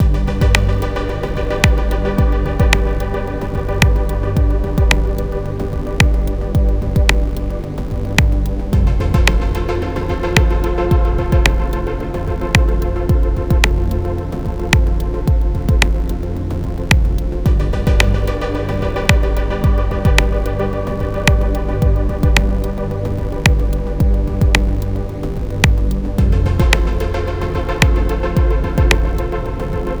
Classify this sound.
Music > Multiple instruments